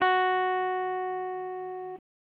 Instrument samples > String
Random guitar notes 001 FIS4 02
electric, electricguitar, guitar, stratocaster